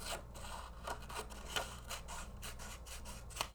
Sound effects > Objects / House appliances
OBJOffc-Blue Snowball Microphone Scissors, Cutting Paper Nicholas Judy TDC
Scissors cutting paper.
scissors, Blue-Snowball, paper, Blue-brand, foley, cut